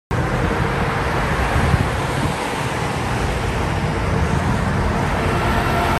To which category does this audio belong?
Sound effects > Vehicles